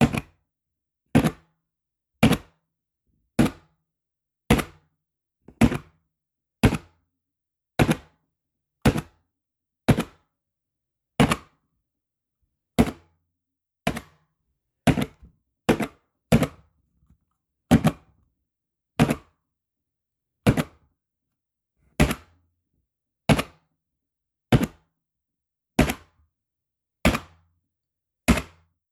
Sound effects > Objects / House appliances
An ink stamp stamping quickly.